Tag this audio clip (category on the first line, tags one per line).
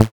Instrument samples > Synths / Electronic
additive-synthesis
bass